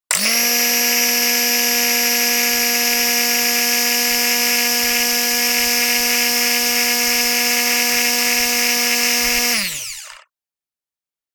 Sound effects > Objects / House appliances
blender motor mode 2
A blender spinning at the speed 2. Recorded with Zoom H6 and SGH-6 Shotgun mic capsule.
blender, blending, kitchen, motor